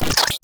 Sound effects > Experimental
Gritch Glitch snippets FX PERKZ-024
otherworldy, percussion, crack, lazer, edm, perc, clap, experimental, snap, impacts, zap, glitch, glitchy, pop, laser, sfx, idm, alien, whizz, abstract, fx, impact, hiphop